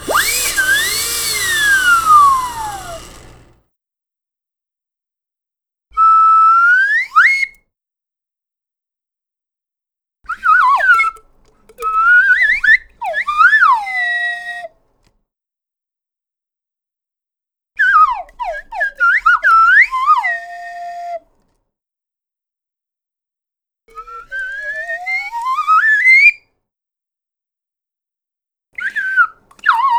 Sound effects > Objects / House appliances
TOONWhis-Blue Snowball Microphone, CU Slide Whistle FX Nicholas Judy TDC
Slide whistle effects.
Blue-brand, Blue-Snowball, cartoon, slide, slide-whistle, whistle